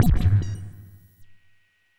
Instrument samples > Synths / Electronic
Benjolon 1 shot30
1SHOT, CHIRP, DRUM, MODULAR, NOISE, SYNTH